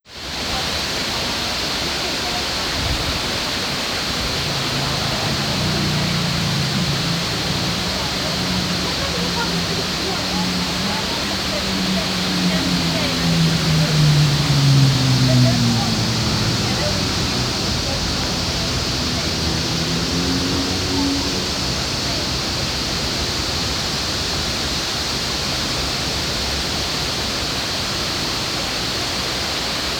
Soundscapes > Urban
051 DMBPARK FOUNTAIN PEOPLE MOTORCYCLE CONSTRUCTION-MACHINE
Sounds recorded between Jardim Botânico and Convento de São Francisco (Coimbra, Portugal, 2018). Recorded with Zoom H4n mk1, using either built-in mics, Røde shotgun (I forgot which model...) and different hydrophones built by Henrique Fernandes from Sonoscopia.
construction, fountain, machine, motorcyle, people